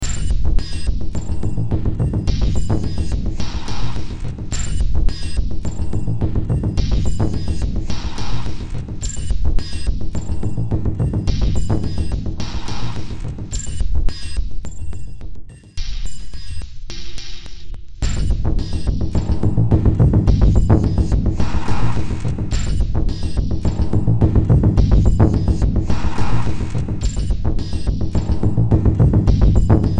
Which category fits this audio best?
Music > Multiple instruments